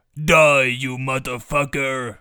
Speech > Solo speech
die you motherfucker
angry, male, man, videogame, violent, voice